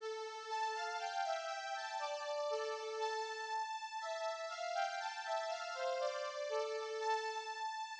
Music > Other
Fragment of an unfinished song with the drums removed. Use for whatever you feel like.